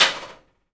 Sound effects > Objects / House appliances
Arrow in something thin
Subject : Probably an accidental hit while recording a whoosh sound. Sounds to me like a metal sheet being penetrated by something like an arrow. While doing a whoosh recording session. Might be a toy arrow. Date YMD : 2025 04 21 Location : Gergueil France. Hardware : Tascam FR-AV2, Rode NT5. Facing upward. Weather : Processing : Trimmed and Normalized in Audacity. Fade in/out.